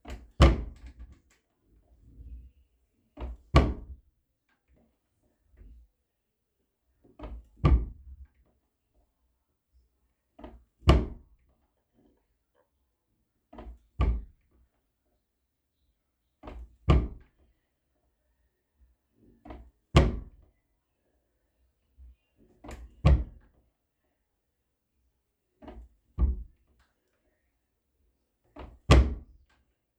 Sound effects > Objects / House appliances
A bathroom cabinet closing.